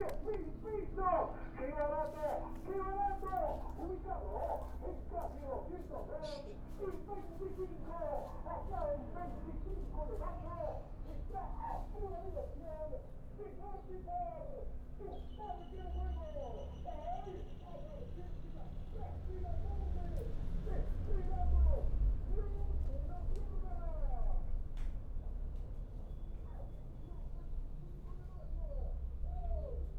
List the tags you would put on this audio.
Soundscapes > Urban
Air
amusement

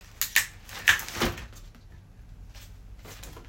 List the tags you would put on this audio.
Sound effects > Objects / House appliances
indoor
movement
Slippers
umbrella
walk

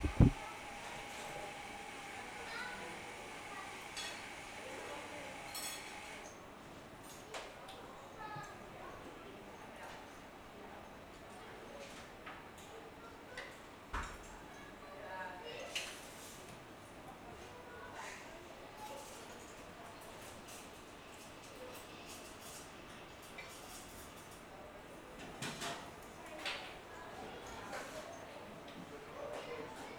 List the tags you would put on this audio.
Soundscapes > Urban
ambiance
field-recording
general-noise

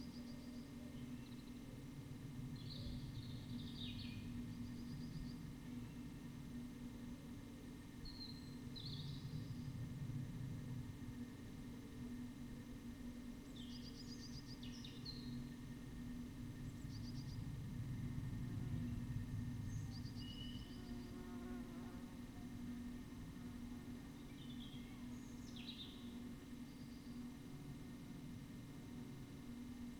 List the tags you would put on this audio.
Soundscapes > Nature
natural-soundscape
nature
field-recording
modified-soundscape
data-to-sound
alice-holt-forest

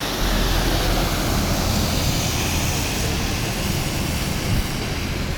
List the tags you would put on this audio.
Sound effects > Vehicles
bus
transportation
vehicle

